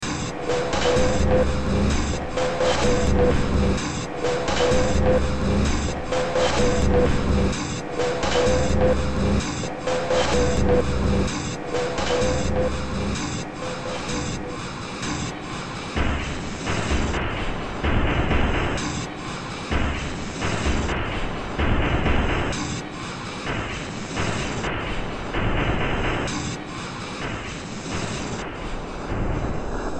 Music > Multiple instruments
Demo Track #3935 (Industraumatic)
Underground, Horror, Ambient, Soundtrack, Cyberpunk, Games, Noise, Industrial, Sci-fi